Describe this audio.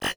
Sound effects > Electronic / Design
RGS-Glitch One Shot 10
Effect, Glitch, One-shot